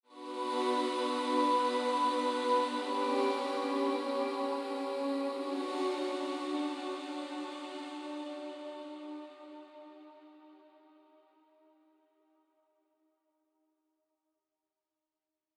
Sound effects > Electronic / Design
A haunting hymn, brought to you by the breath of an angel.